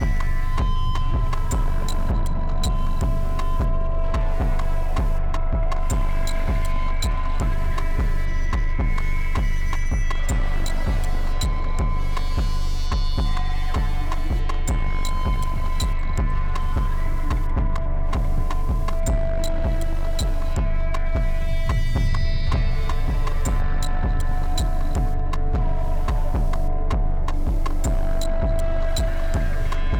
Music > Other
Experimental rhythmic ambient pulse1
Laid back pulsing ambient rhythm. Slightly interrupted syncope, to keep you out of easy-listening mode. Synth back, enjoy and relax to the rhythm!